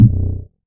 Instrument samples > Other
Kick-bass 808601 and 809153
Speeded up and filtered sound 808601. Two layers of 809153 one reversed with distortion, the other with a Tremolo. Played around with speeds and fades. Tagging this one with "Dare2025-Friction-A" as it's for the Friction series of dares, and A for the edited/processed versions. Given this one was made with Dare2025-08 and Dare2025-09 sounds, and Dare2025-08A requires only sounds of Dare08, I decited to make a umbrella tag of Dare2025-Friction and A for the edited/alternate versions.